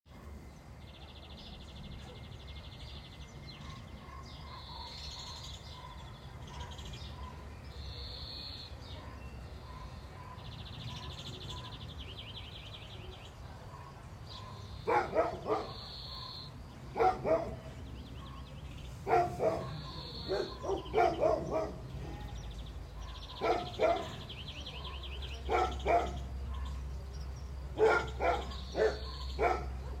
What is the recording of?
Soundscapes > Nature
Birds (and dogs)
Date and Time: 29th april, 2025 at 7:51 pm Location: Moreira do Lima, Ponte de Lima Sound type: Soundmark – natural sound in the countryside Type of microphone used: Iphone 14 omnidirectional internal microphone (Dicafone was the application used) Distance from sound sources: Radius 50 meters